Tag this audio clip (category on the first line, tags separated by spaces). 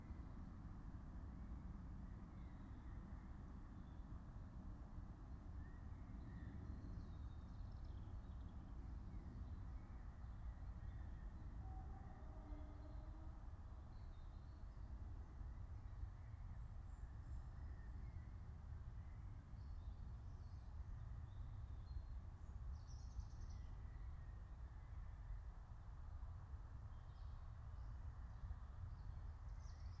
Soundscapes > Nature
raspberry-pi soundscape field-recording meadow phenological-recording nature natural-soundscape alice-holt-forest